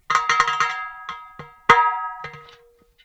Sound effects > Experimental
contact mic in metal thermos, tapping with metal fingers
tapping an empty thermos with a contact microphone inside.
water-bottle, contact-microphone, experimental, contact-mic, water, thermos